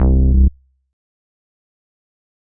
Instrument samples > Synths / Electronic
syntbas0004 C-kr
bass, synth, vst, vsti